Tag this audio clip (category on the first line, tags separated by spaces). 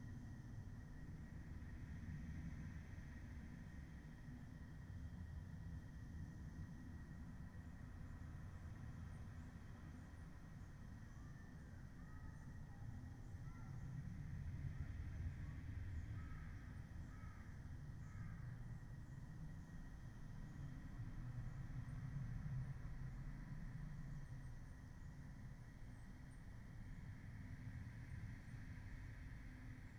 Soundscapes > Nature
raspberry-pi field-recording weather-data phenological-recording modified-soundscape alice-holt-forest sound-installation artistic-intervention data-to-sound soundscape nature Dendrophone natural-soundscape